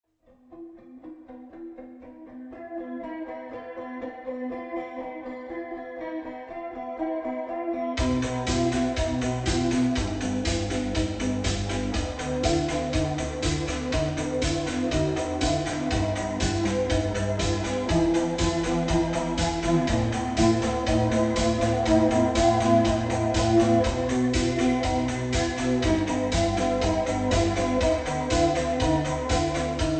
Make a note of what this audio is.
Music > Multiple instruments
1980s Song Fragment - 02
A 1980s style music fragment, number two. Made with a Stratocaster, drum machine, and a few reverbs. Made in FL Studio, 120 bpm.